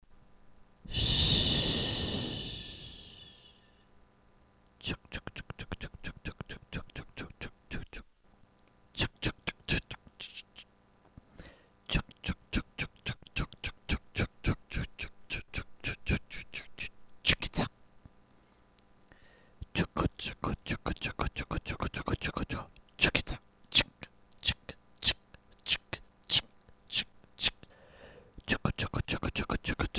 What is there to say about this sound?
Sound effects > Experimental
Vocal FX Chuckchuckchukica
background, effects, experimental, female, fx, vocal, voice